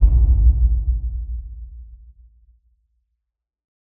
Sound effects > Electronic / Design
NETHER CAVE RATTLING IMPACT
BOOM, BRAZIL, BRASIL, DEEP, BRAZILIAN, BASSY, HIT, EXPLOSION, MANDELAO, BOLHA, LOW, RATTLING, FUNK